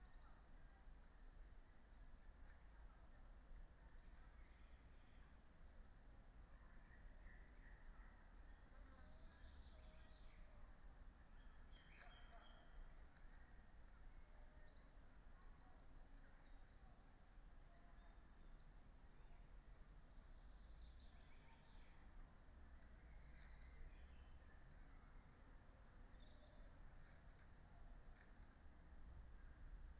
Soundscapes > Nature
Dendrophone is a site-specific sound installation by Peter Batchelor located in Alice Holt Forest, Surrey, UK, that transforms local environmental data into immersive sound textures. These recordings are made directly from the installation’s multichannel output and capture both its generative soundscape and the ambient natural environment. The sounds respond in real-time to three key ecological variables: • Humidity – represented sonically by dry, crackling textures or damp, flowing ones depending on forest moisture levels. • Sunlight energy – conveyed through shifting hissing sounds, juddery when photosynthetic activity is high, smoother when it's low. • Carbon dioxide levels – expressed through breathing-like sounds: long and steady when uptake is high, shorter and erratic when it's reduced. The installation runs on a DIY multichannel system based on Raspberry Pi Zero microcomputers and low-energy amplifiers.